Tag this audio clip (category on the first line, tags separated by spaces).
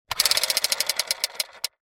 Sound effects > Objects / House appliances
machine rhythm spring